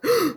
Speech > Solo speech
Exaggerated Surprised Gasp!
A recording I made of my own voice, using a Samsung Galaxy A34 5G, where I inhale quickly to form a shocked gasp. I have an androgynous voice, so you could use it for anyone, be it a man, a woman, a boy, o a girl with a deep voice I guess. If you use it, it would be funny to know where!